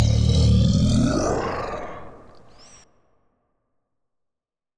Sound effects > Experimental
Creature Monster Alien Vocal FX (part 2)-003
grotesque, Creature, gross, demon, weird, zombie, bite, dripping, Monster, devil, Sfx, howl, snarl, mouth, growl, Alien, fx, otherworldly